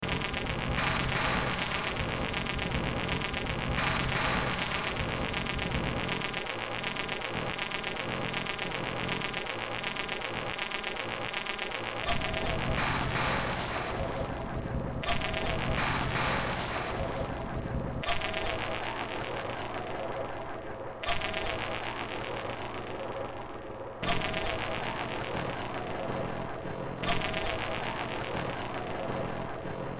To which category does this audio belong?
Music > Multiple instruments